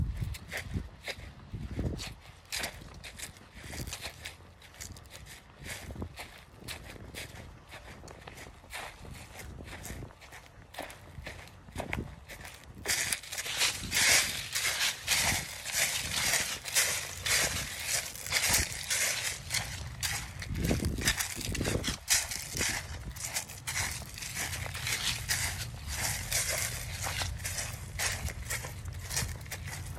Soundscapes > Nature
Brisk walk in leaves a little wind
I recorded this on my iPhone 17 Pro Max or me walking through the leaves in my yard.
crunch, walking, wind